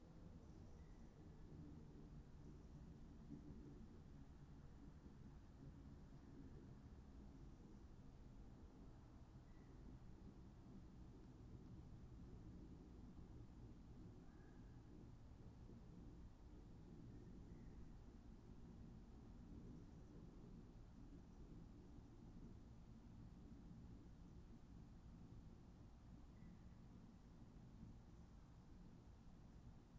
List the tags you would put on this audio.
Soundscapes > Nature
field-recording natural-soundscape modified-soundscape data-to-sound nature weather-data artistic-intervention Dendrophone phenological-recording raspberry-pi sound-installation soundscape alice-holt-forest

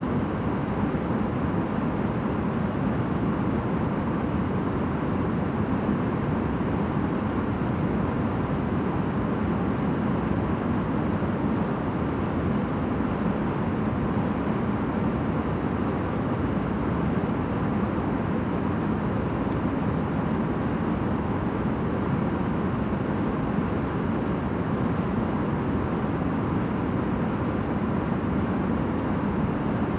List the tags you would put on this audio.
Sound effects > Objects / House appliances
field-recording ambience machine hum

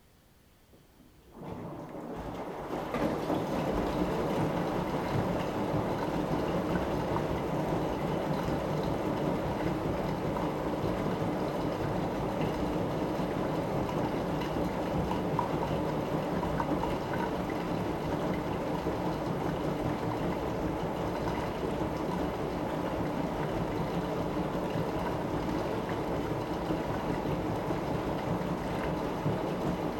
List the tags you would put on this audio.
Sound effects > Objects / House appliances
laundry washing-machine

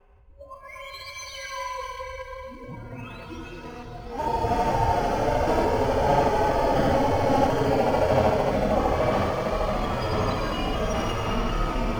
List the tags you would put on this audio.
Sound effects > Electronic / Design
horror dark-soundscapes mystery drowning noise-ambient sound-design scifi content-creator noise dark-design PPG-Wave sci-fi cinematic vst science-fiction

